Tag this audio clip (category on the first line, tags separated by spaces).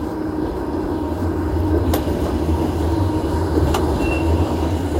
Sound effects > Vehicles
city field-recording Tampere traffic tram